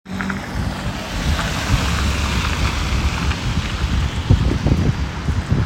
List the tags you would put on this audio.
Sound effects > Vehicles
automobile vehicle

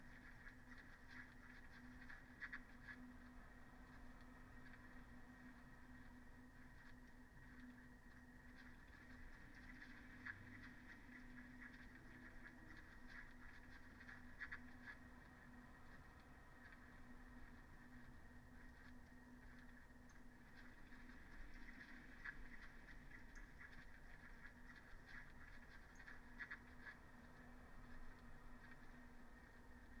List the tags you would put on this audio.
Soundscapes > Nature
alice-holt-forest data-to-sound Dendrophone field-recording natural-soundscape phenological-recording weather-data

